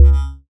Instrument samples > Synths / Electronic
BUZZBASS 2 Db
bass, additive-synthesis, fm-synthesis